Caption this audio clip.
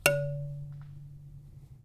Music > Solo instrument
Marimba Loose Keys Notes Tones and Vibrations 20-001
block, foley, fx, keys, loose, marimba, notes, oneshotes, perc, percussion, rustle, thud, tink, wood, woodblock